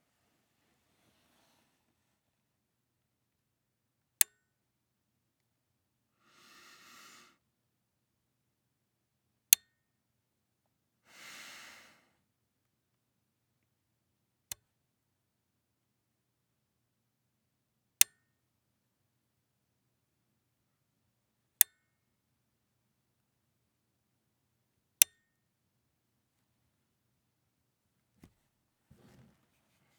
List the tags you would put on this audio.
Sound effects > Other mechanisms, engines, machines
Firearm Weapon

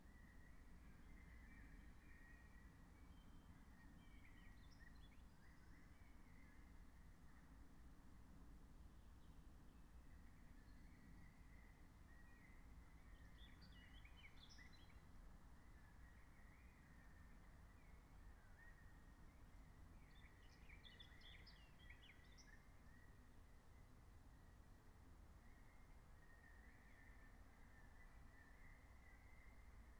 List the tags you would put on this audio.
Soundscapes > Nature
alice-holt-forest field-recording meadow natural-soundscape nature phenological-recording raspberry-pi soundscape

